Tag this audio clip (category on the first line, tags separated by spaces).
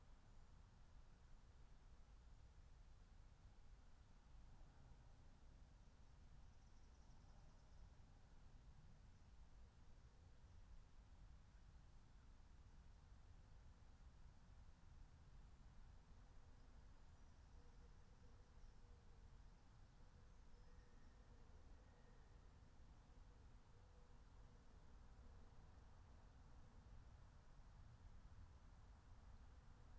Soundscapes > Nature
nature meadow phenological-recording natural-soundscape raspberry-pi soundscape alice-holt-forest field-recording